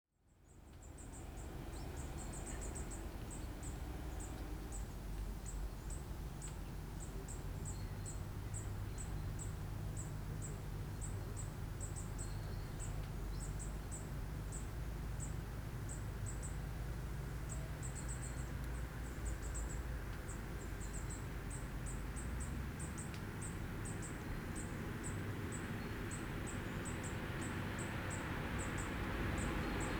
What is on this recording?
Soundscapes > Urban
urban roadside ambience
Outdoor field recording of passing cars with natural ambience, featuring subtle sounds of birds chirping, a couple on a stroll and a bicycle in the background. Recorded on Zoon H4n Pro (stock mics) Main sounds captured at minute: #0:30 car #1:00 couple on a stroll folowing by a car #2:00 car #2:45 car #3:40 bicycle #5:20 bus followed by a car #7:33 car
ambience, background, bicycle, cars, city, couple, environmental, everyday, field, footsteps, life, natural, noise, outdoor, passing, recording, sounds, soundscape, street, traffic, urban, walking